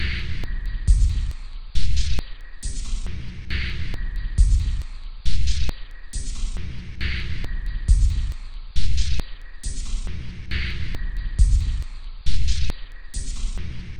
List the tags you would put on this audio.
Instrument samples > Percussion
Ambient Dark Packs Samples Soundtrack Underground

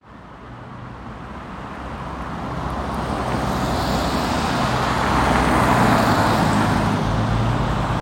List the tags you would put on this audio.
Soundscapes > Urban
traffic vehicle

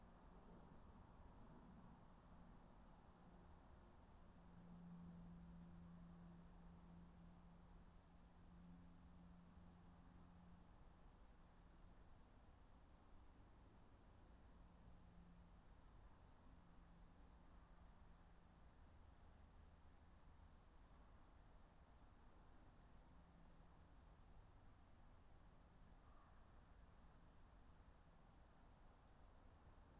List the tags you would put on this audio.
Soundscapes > Nature
artistic-intervention data-to-sound Dendrophone field-recording natural-soundscape nature raspberry-pi sound-installation weather-data

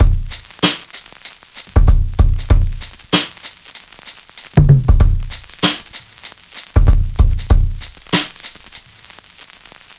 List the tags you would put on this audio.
Music > Solo percussion
Lo-Fi Breakbeat DrumLoop Drum 96BPM Vinyl Dusty Drum-Set Break Drums Vintage Acoustic

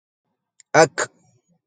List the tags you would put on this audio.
Sound effects > Other
arabic male sound vocal voice

